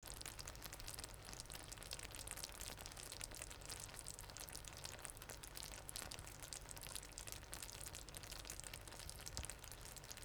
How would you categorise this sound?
Sound effects > Objects / House appliances